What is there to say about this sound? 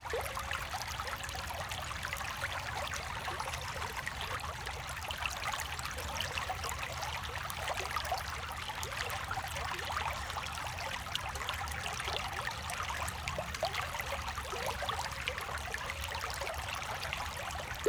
Soundscapes > Nature
r18 babbling brook
Field recording. Babbling brook in North Georgia, United States. Recorded April 7th, 2025, using iPhone 11, version 16.2, and Voice Memos application--no other microphones, mufflers, or modification or edits. Pure nature, pure sound.
babbling, brook, creek, flowing, gurgle, stream, trickle